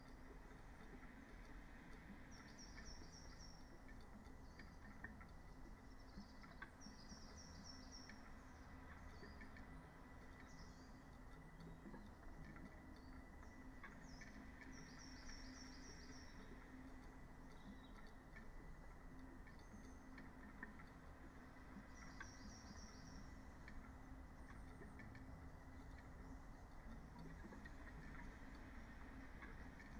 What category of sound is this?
Soundscapes > Nature